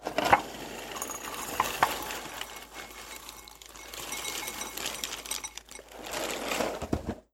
Sound effects > Objects / House appliances
Pouring cereal into bowl.